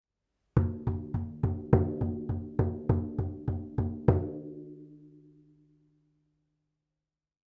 Music > Solo percussion
Shamanic drum multiple strikes (40cm/16 inch)
Multiple strikes using a mallet on a shamanic 45cm/16-inch drum.
percussive, shamanic, 40cm, 16inch, drum, skin, percussion, deep, sound